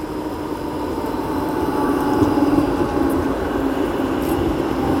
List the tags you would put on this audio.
Sound effects > Vehicles
field-recording city

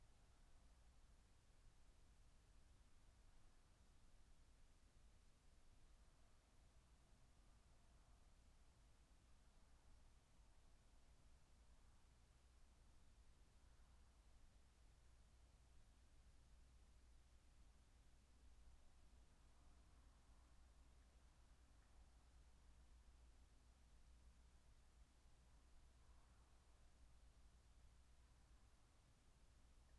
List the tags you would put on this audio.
Soundscapes > Nature
natural-soundscape raspberry-pi sound-installation modified-soundscape Dendrophone alice-holt-forest nature soundscape field-recording phenological-recording data-to-sound artistic-intervention weather-data

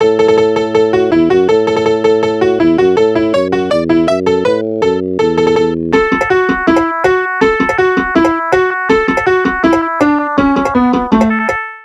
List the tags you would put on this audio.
Music > Multiple instruments
Furnace-tracker Music Original Track

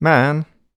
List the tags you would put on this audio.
Speech > Solo speech
dialogue FR-AV2 Human Man Mid-20s Neumann NPC oneshot sad Sadness singletake talk Tascam U67 Video-game Vocal

